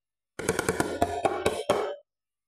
Music > Solo percussion
Loopable drum beats 4
loopable drum beats hits Made with tapping an object like the side of an old drum.